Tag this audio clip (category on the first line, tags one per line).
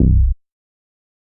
Instrument samples > Synths / Electronic
synth
vsti
vst
bass